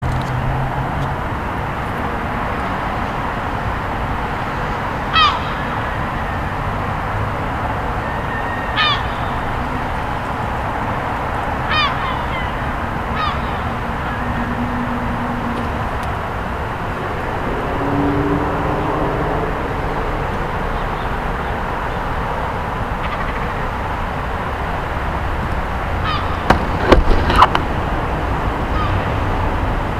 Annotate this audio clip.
Soundscapes > Urban
Just a causal evening recording